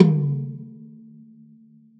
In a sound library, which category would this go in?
Instrument samples > Percussion